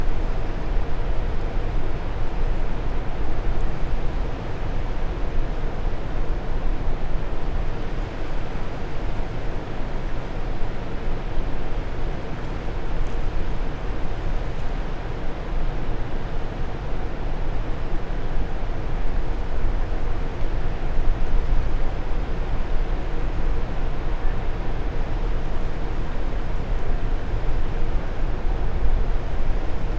Soundscapes > Urban
Subject : Date YMD : 2025 August 11 Early morning : Location : Albi 81000 Tarn Occitanie France. NT5 with a omni capsule (NT5-o). Weather : 24°c ish 60% humidity clear sky, little to no wind (said 10km/h, most locations I was was shielded) Processing : Trimmed and normalised in Audacity.